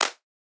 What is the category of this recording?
Instrument samples > Synths / Electronic